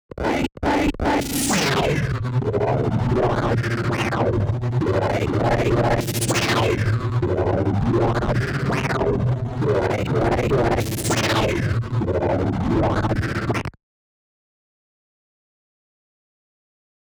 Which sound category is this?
Sound effects > Electronic / Design